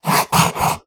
Sound effects > Objects / House appliances
Drawing on notebook paper with an ink fountain pen (lots of pressure), recorded with an AKG C414 XLII microphone.